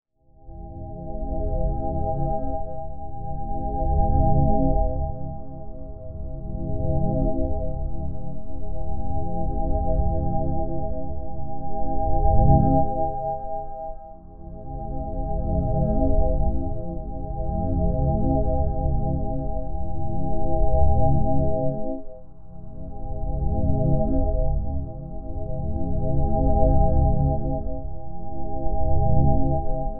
Sound effects > Electronic / Design
A Hole In Reality #1
glowing-portal, glowing-rune, hole-in-reality, hole-in-space-and-time, magical-aura, magical-glow, magic-aura, magic-gate, magic-glow, magic-portal, magic-resonance, magic-rune, magic-vibration, mystical-energy, mystic-energy, mystic-rune, otherworldly-energy, otherworldly-resonance, otherworldly-vibration, portal-hum, reality-shift, shifting-reality, spacetime-flux, strange-resonance, strange-vibration, wormhole